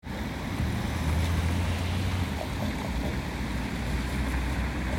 Sound effects > Vehicles
Car driving in Tampere. Recorded with iphone in fall, humid weather.
auto, car, city, field-recording, street, traffic